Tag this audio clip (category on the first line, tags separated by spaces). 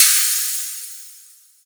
Instrument samples > Percussion
Cymbal Enthnic FX Magical Percussion Synthtic